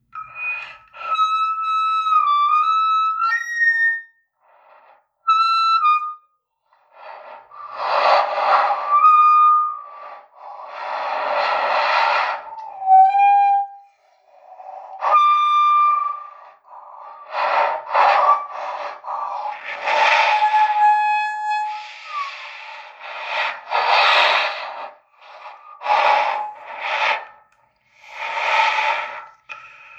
Sound effects > Objects / House appliances
COMMic-Samsung Galaxy Smartphone, CU Megaphone, Feedbacks 01 Nicholas Judy TDC
feedback, squeal, megaphone, loud, Phone-recording